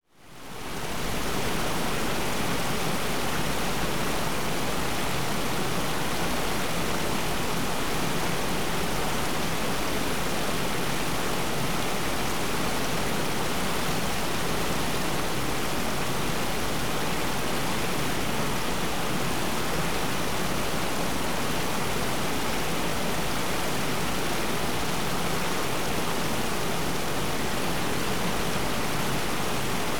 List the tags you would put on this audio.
Soundscapes > Nature
flow field-recording reservoir drain water

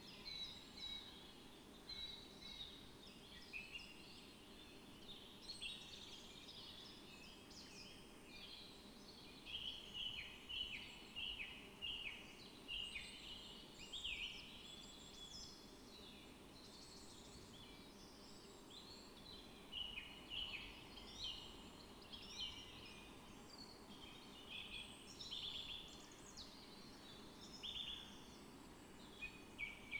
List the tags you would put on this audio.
Soundscapes > Nature
sound-installation natural-soundscape alice-holt-forest field-recording soundscape data-to-sound modified-soundscape raspberry-pi phenological-recording nature Dendrophone artistic-intervention weather-data

